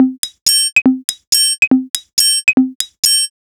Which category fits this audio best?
Music > Solo percussion